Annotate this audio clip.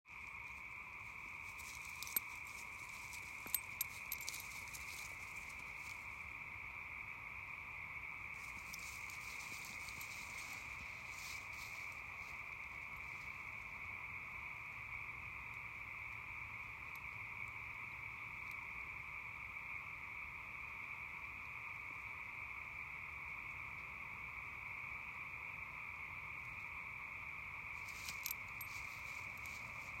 Soundscapes > Nature
Frogs in the night
countryside, dog, farm, field-recording, frogs, nature